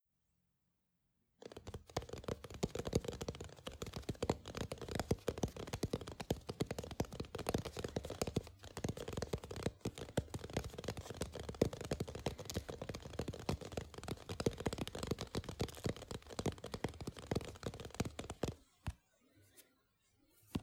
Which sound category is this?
Sound effects > Animals